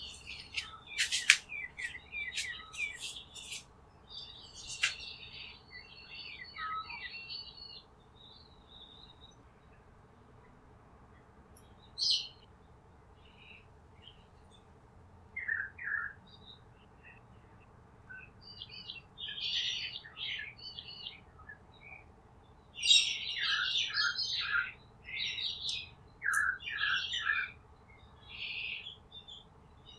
Sound effects > Animals
BIRDMisc 32bF Currawong Finch Robin Misc
Sound of birds singing on a suburban morning Recorded on a Zoom F3
morning, cockatoo, finch, rosella, field-recording, birdsong, birds, robin